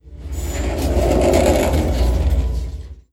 Sound effects > Objects / House appliances
SPRTSkate-Samsung Galaxy Smartphone, CU Skateboard Pass By Nicholas Judy TDC
A skateboard passing by. Recorded at Goodwill.
skateboard, pass-by, Phone-recording, foley